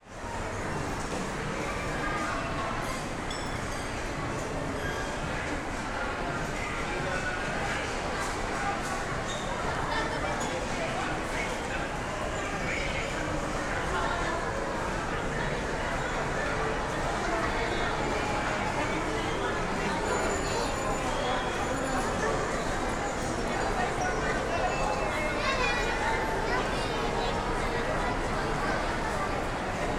Soundscapes > Indoors
250802 131812 PH Walking through Filipino mall
Walking through SM Batangas shopping mall (binaural, please use headset for 3D effects). I made this binaural recording while walking through SM Batangas shopping mall (in Batangas city, Batangas, Philippines). One can hear the atmosphere of this big crowded mall, with adults and children, music from the stores and restaurants, and ice cream sellers shaking their hand-bells (which is quite typical in the Philippines). Recorded in August 2025 with a Zoom H5studio and Ohrwurm 3D binaural microphones. Fade in/out and high pass filter at 60Hz -6dB/oct applied in Audacity. (If you want to use this sound as a mono audio file, you may have to delete one channel to avoid phase issues).
children, soundscape, Philippines, crowded, busy, noisy, walla, hubbub, ambience, adults, shops, voices, men, field-recording, women, Batangas-city, atmosphere, shopping, binaural, music, people, mall, hand-bell, lively, store, crowd, kids, noise, shop